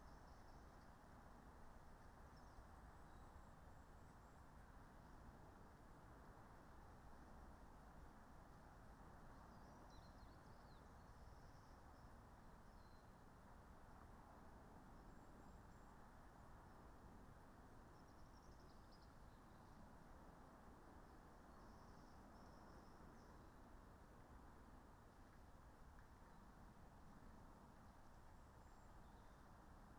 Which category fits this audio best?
Soundscapes > Nature